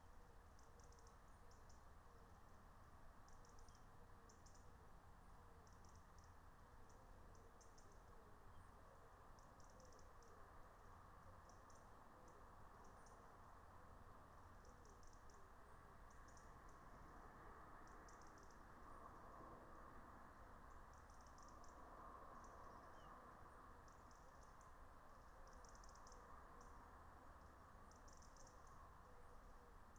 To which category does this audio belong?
Soundscapes > Nature